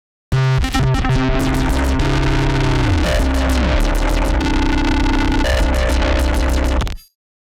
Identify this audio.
Sound effects > Electronic / Design
Optical Theremin 6 Osc Shaper Infiltrated-029
Alien, Analog, Chaotic, Crazy, DIY, EDM, Electro, Electronic, Experimental, FX, Gliltch, IDM, Impulse, Loopable, Machine, Mechanical, Noise, Oscillator, Otherworldly, Pulse, Robot, Robotic, Saw, SFX, strange, Synth, Theremin, Tone, Weird